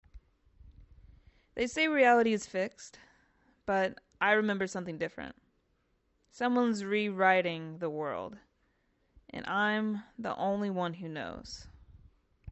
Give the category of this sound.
Speech > Solo speech